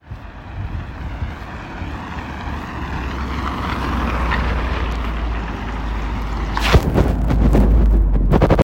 Soundscapes > Urban
Car passing recording 2
Road, Cars, Transport